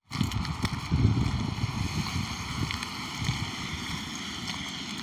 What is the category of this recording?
Sound effects > Vehicles